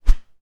Sound effects > Objects / House appliances
Whoosh - Plastic Hanger 2 (middle clip) 8
Subject : Whoosh from a plastic clothe hanger. With clips adjustable across the width of it. I recorded whooshes with the clip on the outer edge and near the center hanger. Middle clip here refers to being closest to the middle of the hanger. Date YMD : 2025 04 21 Location : Gergueil France. Hardware : Tascam FR-AV2, Rode NT5 pointing up and towards me. Weather : Processing : Trimmed and Normalized in Audacity. Probably some fade in/out.
NT5, Hanger, Transition, Plastic, FR-AV2, swing, Rode, Fast, SFX, Whoosh, swinging, coat-hanger, Tascam